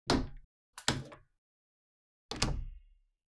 Sound effects > Objects / House appliances
wooden door open, close
close, door, open, wood, wooden